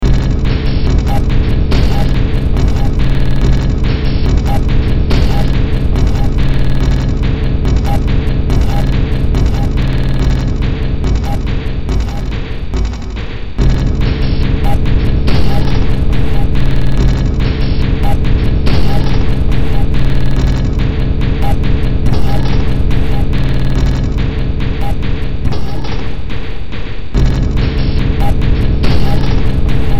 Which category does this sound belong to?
Music > Multiple instruments